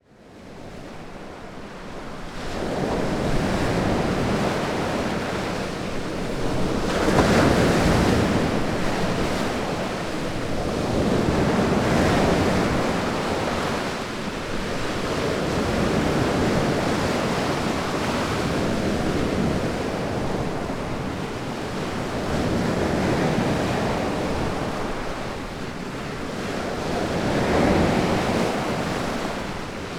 Soundscapes > Nature
250815 151347 PH Strong waves at Masasa beach
Strong waves at Masasa Beach. I made this recording during a windy and wavy day, on a beautiful sand beach called Masasa beach, located in the south of Tingloy island, in Batangas province, Philippines. Hopefully, I found a nice place sheltered from the wind, ideal to record theses strong and big waves ! Recorded in August 2025 with a Zoom H5studio (built-in XY microphones). Fade in/out applied in Audacity.
field-recording, splashing, Philippines, ambience, water, waves, tingloy, strong, atmosphere, splash, coast, surf, sand, soundscape, seaside, sea, big, ocean, crashing, beach, shore, island, surfing